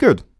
Speech > Solo speech
Relief - Good 2
U67, FR-AV2, Male, Human, Voice-acting, Mid-20s, oneshot, Tascam, good, talk, voice, Relief, Single-take, NPC, Video-game, happy, Vocal, dialogue, releif, Man, singletake, Neumann